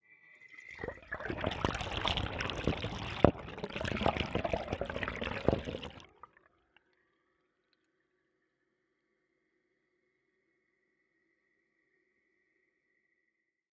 Sound effects > Objects / House appliances
A hydrophone recording of toilet water being flushed.
bathrrom, hydrophone, tap, toilet, water